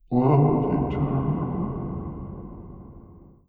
Speech > Processed / Synthetic
Recorded "Bloody terror" and distorted with different effects.